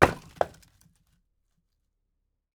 Sound effects > Natural elements and explosions
Throwing a log on a pile of logs XY 2
Subject : Pile of wood logs. Date YMD : 2025 04 22 Location : Inside a Barn Gergueil France. Hardware : Tascam FR-AV2, Rode NT5 in a XY configuration. Weather : Processing : Trimmed and Normalized in Audacity.
2025, FR-AV2, hitting, landing, Log, logs, NT5, plock, Rode, Tasam, throwing, Wood, Wooden, XY